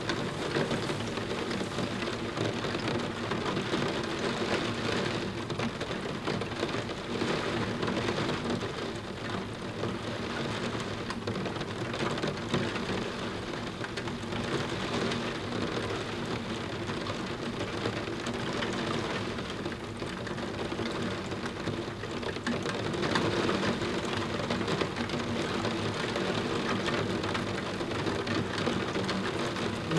Soundscapes > Nature
Raindrops hitting a window with steady intensity, creating a close and detailed sound.
ambience, drops, hitting, rain, storm, weather, wet, window